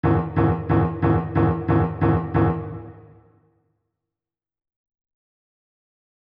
Music > Other
Unpiano Sounds 001
Distorted, Distorted-Piano